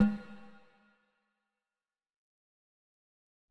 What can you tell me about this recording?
Music > Solo percussion
Snare Processed - Oneshot 156 - 14 by 6.5 inch Brass Ludwig
hit, oneshot, realdrums, sfx, snareroll, hits, perc, realdrum, reverb, drum, flam, percussion, rimshots, kit, ludwig, beat, acoustic, crack, drums, brass, processed, snaredrum, drumkit, snare, rim, rimshot, fx, roll, snares